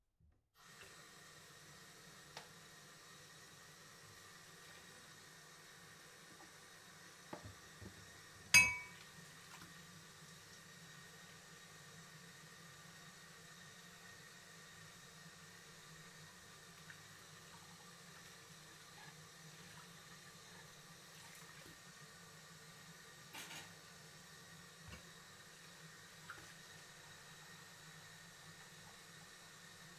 Soundscapes > Indoors
A person is washing dishes in the kitchen. The following equipment was used: Audio-Technica AT2022 microphone, ZOOM F3 recorder.